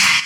Instrument samples > Percussion
China 1 - 19 inches Zildjian Z3 short
Slightly low-pitched part of my Zildjian China and spock crashes in various lengths (see my crash folder). tags: spock Avedis bang China clang clash crack crash crunch cymbal Istanbul low-pitchedmetal Meinl metallic multi-China multicrash Paiste polycrash Sabian shimmer sinocrash Sinocrash sinocymbal Sinocymbal smash Soultone Stagg Zildjian Zultan
Istanbul, Meinl, Paiste, polycrash, Sabian, Stagg